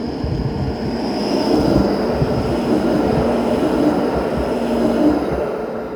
Soundscapes > Urban
voice 14-11-2025 1 tram
Tram, TramInTampere